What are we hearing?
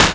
Instrument samples > Percussion
8 bit-Noise Snare 1
FX, game, percussion